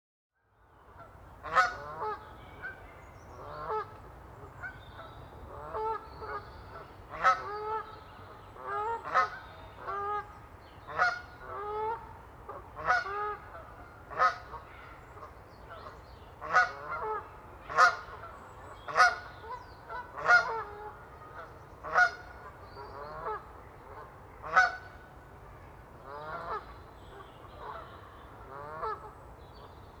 Soundscapes > Nature
A morning recording at Wolseley Nature Reserve, Staffordshire. Zoom H6 Studio, Mono. XY Mics.